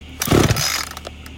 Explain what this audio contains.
Sound effects > Other mechanisms, engines, machines
weed wacker pull cord
i made this for doom but you can do what ever also this is ECHO 21.2 cc Gas 2-Stroke Straight Shaft String Trimmer SRM-225 if you want to know the weed Wacker also this is without the stop switch off